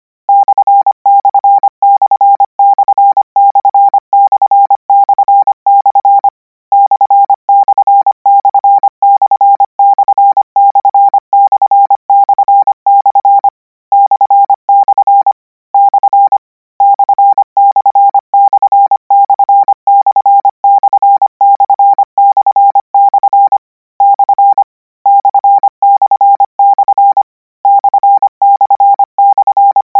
Sound effects > Electronic / Design
Koch 23 / - 200 N 25WPM 800Hz 90
Practice hear symbol '/' use Koch method (practice each letter, symbol, letter separate than combine), 200 word random length, 25 word/minute, 800 Hz, 90% volume.